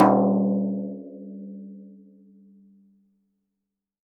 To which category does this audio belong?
Music > Solo instrument